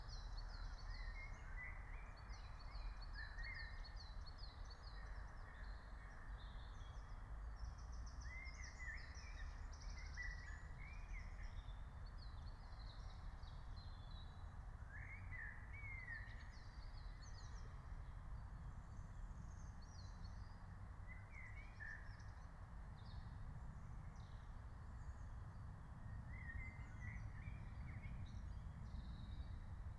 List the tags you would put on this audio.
Soundscapes > Nature
meadow raspberry-pi alice-holt-forest nature natural-soundscape phenological-recording field-recording soundscape

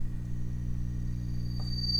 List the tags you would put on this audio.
Sound effects > Electronic / Design
electric noise radio static wave

